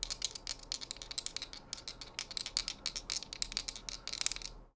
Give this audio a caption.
Sound effects > Objects / House appliances
GAMEMisc-Blue Snowball Microphone, CU Dice, Shake, In Hand Nicholas Judy TDC
Dice being shaken in hand.
shake, foley, Blue-Snowball